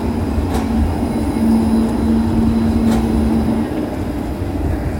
Sound effects > Vehicles
Tram driving near station at low speed in Tampere. Recorded with iphone in fall, humid weather.